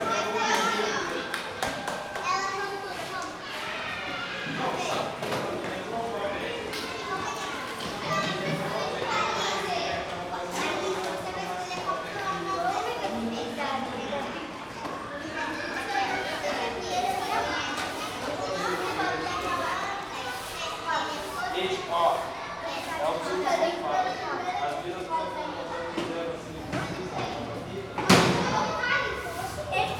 Soundscapes > Indoors
6 - Crianças conversando e brincando na escola - Kids talking and playing on school (brazilian portuguese)
Áudio gravado no Colégio Objetivo de Botafogo, na cidade do Rio de Janeiro (Rua Álvaro Ramos, n° 441) no dia 6 de outubro de 2022. Gravação originalmente feita para o documentário "Amaro: O Colégio da Memória", sobre o vizinho Colégio Santo Amaro, que fechou durante a pandemia. Crianças brincando e conversando, relativamente perto do microfone. Vozes de adultos (inspetores) aparecem ocasionalmente e há uma faxineira varrendo o chão. No final, falo que foi gravado no lugar onde as crianças estavam lanchando, onde havia poucas delas, a maioria já tinha subido para jogar futebol e brincar no parquinho. Foi utilizado o gravador Zoom H1N. // Audio recorded at the Objetivo School in the Botafogo neighborhood, in Rio de Janeiro, on the october 6th, 2022. Recording originally made for the brazilian documentary feature film "Amaro: The School in Our Memory", which tells the story of the Santo Amaro School, also located in Botafogo, but closed during the pandemic.
brasil, brasileiros, brazil, brazilians, broom, child, children, escola, infantil, kid, kids, kindergarten, patio, playground, playing, portugues, portuguese, school, shcool-yard, voices, vozerio, zoomh1n